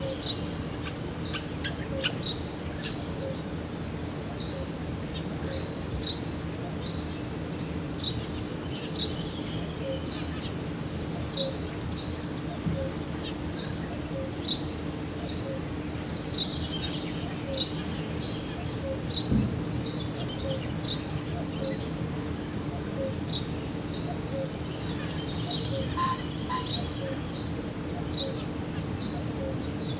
Soundscapes > Nature
Burdocia dawn
Soundscape recorded in the early hours of the morning, from the window of a room on the first floor of a house in the Ferrara countryside, surrounded by a large green park. The recording was made using a ZOOM H1n handheld recorder with a windscreen. Many biophonic sounds can be heard:
pheasants;
doves;
fluttering and flapping sounds;
cuckoos;
crickets;
frogs;
crows and magpies
Other anthropophonic sounds are:
sounds of farm machinery in the background in the distance (mostly, I think, water pump engines extracting water from canals/ditches for irrigating fields);
a sound of a distant plane passing by over our heads;
Processing:
Low pass filter;
gentle denoising (ReaFIR);
volume boost
ambience, ambient, birds, field-recording, freesound20, italy, nature, outdoor, summer